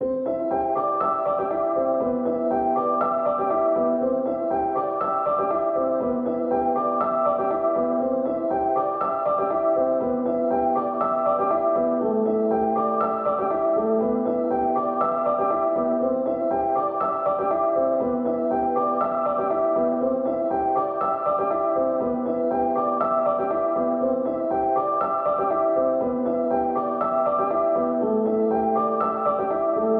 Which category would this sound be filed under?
Music > Solo instrument